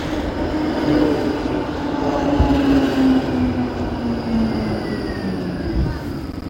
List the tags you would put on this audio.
Sound effects > Vehicles
tram field-recording Tampere

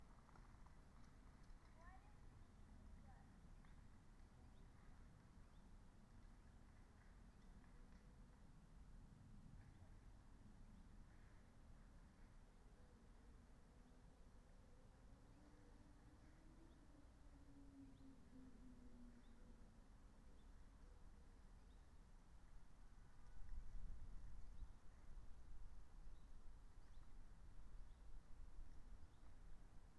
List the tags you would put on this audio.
Soundscapes > Nature
natural-soundscape alice-holt-forest meadow raspberry-pi field-recording soundscape phenological-recording nature

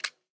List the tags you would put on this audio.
Sound effects > Human sounds and actions
activation button click interface off switch toggle

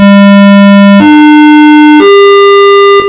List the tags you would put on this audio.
Sound effects > Electronic / Design
System AFACS PA Alert Intercom Audacity Announcement Lu-Tech

